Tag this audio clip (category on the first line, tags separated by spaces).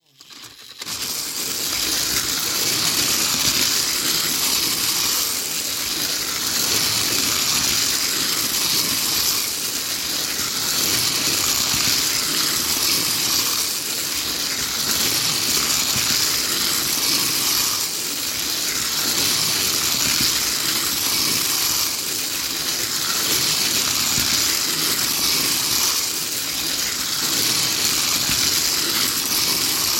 Sound effects > Objects / House appliances
toy train run